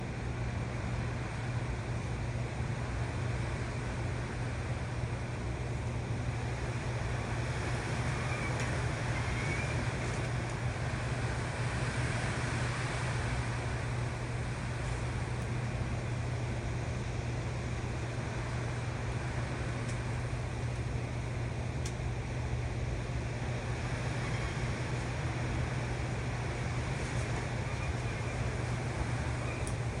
Soundscapes > Urban
windy ambience loop
recorded on an iphone 13 by me occasionally creaking and leaves can be heard
loop, outdoors, wind